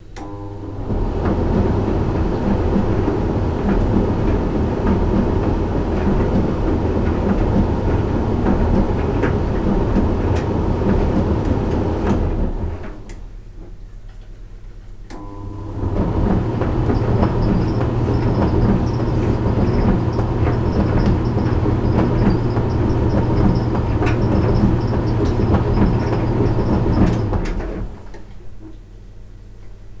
Sound effects > Objects / House appliances
Quite a long (and very easy to loop) recording of a tumble drier going through multiple short cycles then one big long cycle Window was open and it was raining when this was recorded so you may hear some slight sounds of rain but it's hard to notice Recorded with a 1st Generation DJI Mic and Processed with ocenAudio
bathroom, clothes, clothing, drier, dryer, laundromat, laundry, machine, rumbling, tumble, wash, washer, washing, washing-machine